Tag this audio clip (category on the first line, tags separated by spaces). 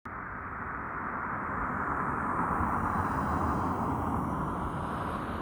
Sound effects > Vehicles
car engine vehicle